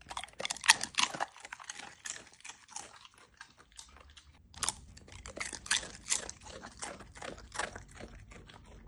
Sound effects > Animals
Malinois Belgian Shepherd dog eating a single chip (crisp), two takes. Cut point at 4seconds 16659samples. iPhone 15 Pro video recordings extracted via Audacity 3.7.5.